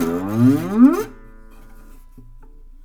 Music > Solo instrument
acoustic guitar slide19
chord, string, guitar, chords